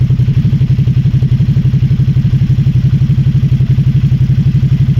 Sound effects > Other mechanisms, engines, machines
puhelin clip prätkä (9)
Supersport, Motorcycle